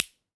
Sound effects > Human sounds and actions
Adult Fingersnap (Stereo)
Subject : An adult fingersnapping. Date YMD : 2025 04 20 Location : Gergueil France. Hardware : A Zoom H2n in MS mode. Processed the sound using Mid and side to make a stereo recording. Weather : Processing : Trimmed and Normalized in Audacity.